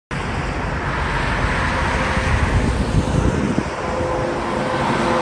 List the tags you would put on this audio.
Sound effects > Vehicles
road
car
highway

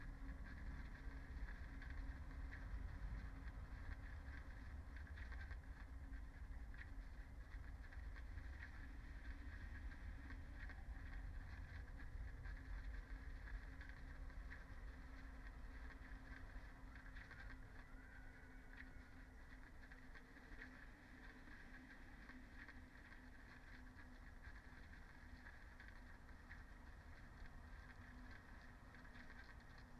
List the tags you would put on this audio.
Soundscapes > Nature
soundscape
field-recording
modified-soundscape
data-to-sound
natural-soundscape
Dendrophone
phenological-recording
nature
weather-data
raspberry-pi
alice-holt-forest
sound-installation
artistic-intervention

